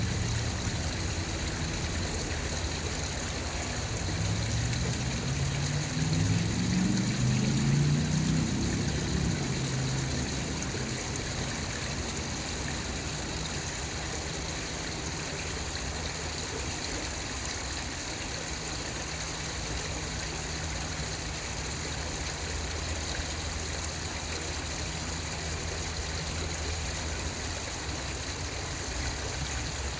Sound effects > Natural elements and explosions
A river and small falls. Looped. Recorded at Bass Pro Shops.